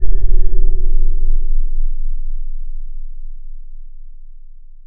Sound effects > Electronic / Design
LOW SUBMERGED IMPACT
DIFFERENT, DEEP, TRAP, EXPERIMENTAL